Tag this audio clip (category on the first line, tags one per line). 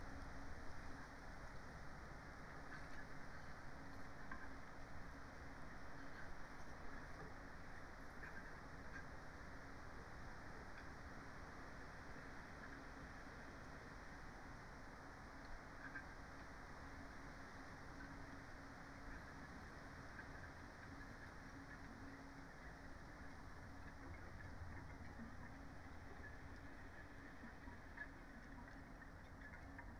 Soundscapes > Nature
Dendrophone
phenological-recording
nature
modified-soundscape
sound-installation
artistic-intervention
natural-soundscape
alice-holt-forest
data-to-sound
soundscape
field-recording
weather-data
raspberry-pi